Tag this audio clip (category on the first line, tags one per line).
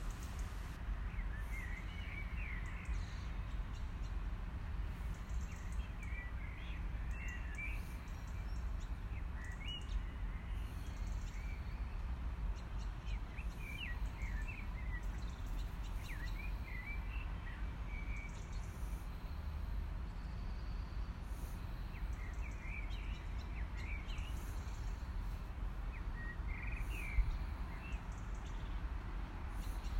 Sound effects > Animals
field-recording
birds
nature